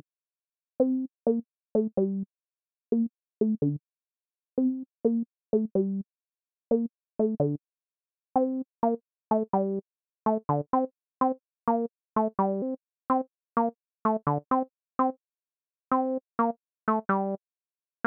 Music > Solo instrument
Acid loop recording from hardware Roland TB-03
303, Acid, electronic, hardware, house, Recording, Roland, synth, TB-03, techno